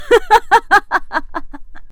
Sound effects > Human sounds and actions
Woman's laugh 1
A woman giggling, recorded indoor, background noises removed.
laugh, giggle, laughter, voice, chuckle, haha, woman, female, laughing